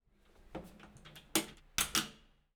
Sound effects > Objects / House appliances
Hotel room door closing (close-up perspective)
This is the sound a keycard-operated hotel room door makes when recorded closing from inside the room. Perspective: Close-up.
room, closing, hotel, door, handle